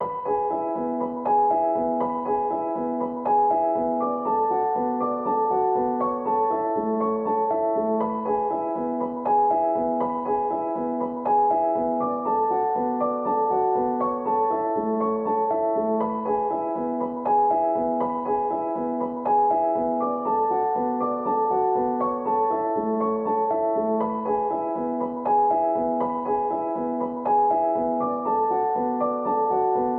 Music > Solo instrument
Piano loops 199 octave short loop 120 bpm
Beautiful piano harmony inspired by the work of Danny Elfman. This sound can be combined with other sounds in the pack. Otherwise, it is well usable up to 4/4 120 bpm.
120, 120bpm, free, loop, music, piano, pianomusic, reverb, samples, simple, simplesamples